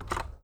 Sound effects > Objects / House appliances
COMTelph-Blue Snowball Microphone Nick Talk Blaster-Telephone, Receiver, Pick Up 05 Nicholas Judy TDC
A telephone receiver being picked up.
receiver, foley, Blue-brand, Blue-Snowball, telephone, pick-up